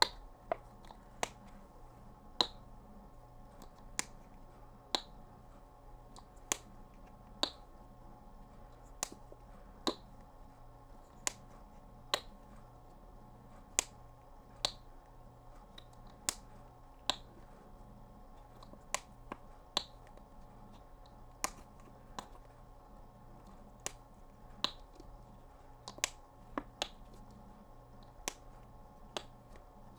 Sound effects > Objects / House appliances
A sour slurper bottle opening and closing.